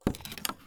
Sound effects > Other mechanisms, engines, machines
Woodshop Foley-048
bam, crackle, wood